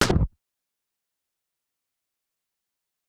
Sound effects > Experimental
dark verb impact 1
zap, laser, perc, whizz, clap, otherworldy, impacts